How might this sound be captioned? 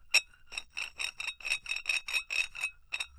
Sound effects > Objects / House appliances
Scraping Glass
sound of two glass bottle being scraped together, recorded with sure sm57 into adobe audition for a university project